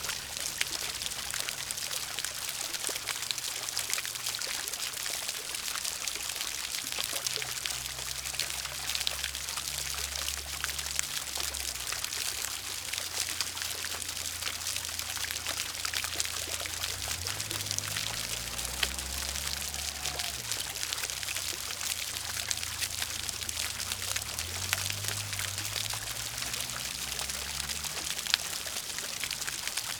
Sound effects > Natural elements and explosions
250710 20h24 Esperaza Place de la laïcité - NW edge - Top of fountain - MKE600
Subject : Recording the fountain from Place de la laicité in Esperaza. Here focusing on top of the fountian. Sennheiser MKE600 with stock windcover P48, no filter. Weather : Processing : Trimmed in Audacity.
2025, Aude, Hypercardioid, MKE-600, MKE600, FR-AV2, Juillet, Shotgun-microphone, Single-mic-mono, 11260, Esperaza, top, output, Tascam, Shotgun-mic, July, Sennheiser